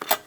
Sound effects > Objects / House appliances
close tape deck door
foley perc sfx fx percussion sample field recording